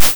Instrument samples > Percussion
posessed noise
i-like-tacos-tbh, hi, hi-hat, hihat, hat, feyuwrjcgnf3yg4874r784rfn7c4r, arbys, hihats, hi-hats, industrial